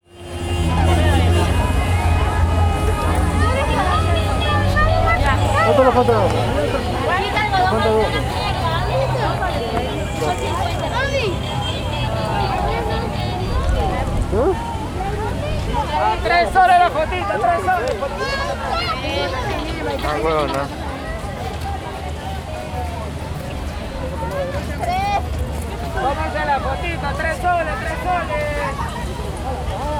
Soundscapes > Urban
Ambiente parque fotosy comida Lima Peru
At the park exit, you walk through a traffic jam. You come across several street vendors saying "3 soles for a photo," among others.
PARK, FOOD, field-recording, AMBIENTAL, soundscape, lima, PHOTOS, peru